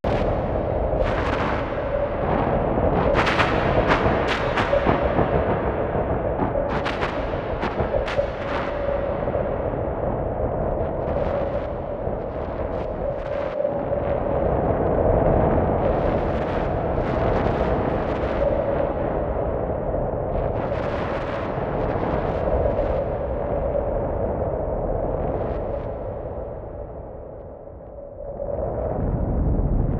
Soundscapes > Other
This sound is based on my own recorded voice, transformed and shaped into a mystical wind-like texture. The original vocal recording was edited and processed using the Spacecraft granular synthesis application, creating an ambient, airy, and slightly sacred atmosphere. The result evokes the feeling of wind echoing through an old stone church or temple — suitable for cinematic soundscapes, ritual scenes, meditation, fantasy, sci-fi, or experimental audio projects. Source: – Original voice recording – Edited and processed in Spacecraft (granular synthesis)
Scary, Drone, Strange, Ambience, Ambiance, Environment, Mystery, Movie, Atmosphere, Film, Creepy, Cinematic, Eerie, Spooky, Horror, Sci-Fi, Amb, Fantasy, Sound-Design, Wind, Ambient